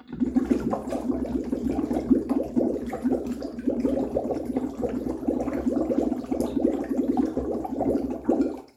Sound effects > Natural elements and explosions
WATRBubl-Samsung Galaxy Smartphone Bubbles, Gurgle, Short Nicholas Judy TDC
Short bubbles gurgling.